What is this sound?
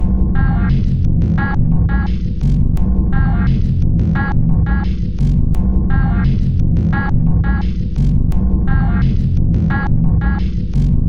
Instrument samples > Percussion
This 173bpm Drum Loop is good for composing Industrial/Electronic/Ambient songs or using as soundtrack to a sci-fi/suspense/horror indie game or short film.
Dark, Drum, Industrial, Loopable, Packs, Samples, Underground, Weird